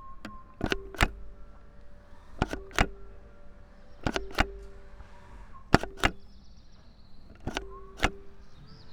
Sound effects > Objects / House appliances
250607 05h10ish Albi Madeleine - Traffic light crossing button
Subject : The crossing button at traffic lights being clicked/pressed Date YMD : 2025 06 07 (Saturday). Early morning. Time = Location : Albi 81000 Taarn Occitanie France. Hardware : Tascam FR-AV2, Rode NT5 with WS8 windshield. Had a pouch with the recorder, cables up my sleeve and mic in hand. Weather : Grey sky. Little to no wind, comfy temperature. Processing : Trimmed in Audacity. Other edits like filter, denoise etc… In the sound’s metadata. Notes : An early morning sound exploration trip. I heard a traffic light button a few days earlier and wanted to record it in a calmer environment.
Outdoor, button, France, FR-AV2, Early-morning, click, hand-held, WS8, Urbain, NT5, morning, traffic-light, Single-mic-mono, Occitanie, Mono, Albi, handheld, crossing, Tarn, 2025, Rode, City, Wind-cover, Tascam, Early, Saturday, pressing, 81000